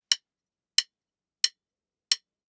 Music > Solo percussion
Counting with drumsticks. Four strokes. Recorded at the studio using the Zoom H5Studio recorder. Write in the comments where you plan to use this sound. Please give me five stars in the rating.
beat, beginning, clicks, countdown, drums, intro, music, percs, percussion, rhythm, rithm, sticks, wood